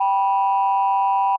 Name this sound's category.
Instrument samples > Synths / Electronic